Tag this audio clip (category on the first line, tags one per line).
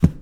Sound effects > Objects / House appliances
debris
foley
scoop
liquid
hollow
plastic
fill
metal
pail
handle
clatter
tip
bucket
knock
cleaning
shake
water
slam
garden
clang
pour
object
lid
container
drop
household
kitchen
tool
spill
carry